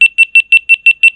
Sound effects > Electronic / Design
This is the sound when the GoPro is shutting down. Software: Audacity Microphone: Blue Yeti Nano Premium